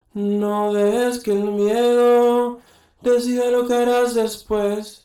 Music > Other
Latam Venezuelan Spanish Vocal Performance
I sing the following phrase: "no dejes que el miedo decida lo que haras despues"
freesample, human, latam, male, music, spanish, vocal, voice